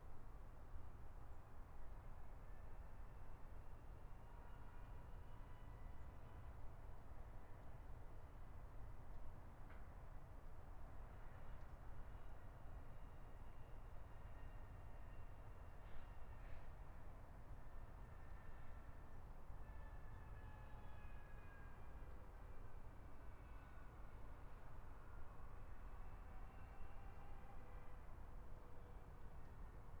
Soundscapes > Urban
AMBTraf Overgrown oil harbour with highway traffic and birds, Karlskrona, Sweden
Recorded 08:53 28/08/25 It’s morning in this harbour area that used to have oil silos. Traffic from a nearby highway is heard, and one construction vehicle drives past in the beginning. In this fenced area are plenty of bushes where blue tits sit. Seagulls fly around, one young seagull wheezing at the end, there are even rabbits hopping around. Zoom H5 recorder, track length cut otherwise unedited.
Karlskrona, Traffic, Birds, Seagulls, Industrial, Urban, Harbour, Town, Ambience, Cars, Morning, Overgrown, Tit, Sweden, Oil, Blue, Highway, Field-Recording